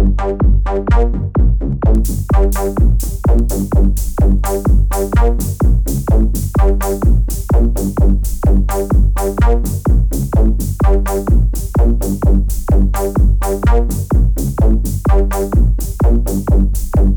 Music > Multiple instruments
Simple Tech Based Industrial Loop
Made in FL11, basic samples with a bit of effects.